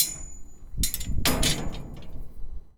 Sound effects > Objects / House appliances
Junkyard Foley and FX Percs (Metal, Clanks, Scrapes, Bangs, Scrap, and Machines) 62
garbage, Foley, Ambience, dumping, Bang, Metallic, Environment, Metal, Bash, Clank, Junkyard, FX, SFX, Dump, Junk, Clang, rattle, Percussion, scrape, dumpster, Perc, trash, Machine, waste, tube, Smash, Atmosphere, Robotic, Robot, rubbish